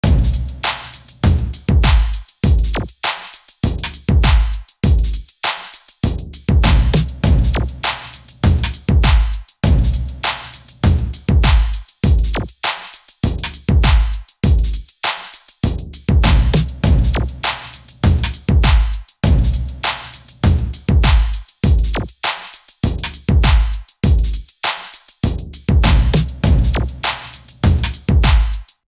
Music > Solo percussion
Ableton Live. VST.Fury-800.......Drums 100 BPM Free Music Slap House Dance EDM Loop Electro Clap Drums Kick Drum Snare Bass Dance Club Psytrance Drumroll Trance Sample .
100, BPM, Clap, Dance, Drum, EDM, Electro, House, Kick, Music, Slap